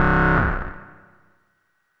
Instrument samples > Synths / Electronic
1 shot sound sampled from a modular synth. gear used: diy benjolin from kweiwen kit, synthesis technology e440 and e520, other control systems....... percussive modular synth hit. throw these in a sampler or a daw and GET IT